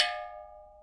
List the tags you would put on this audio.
Sound effects > Objects / House appliances
fieldrecording object sfx stab

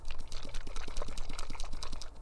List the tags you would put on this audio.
Sound effects > Objects / House appliances
Blue-brand Blue-Snowball magic-8-ball shake

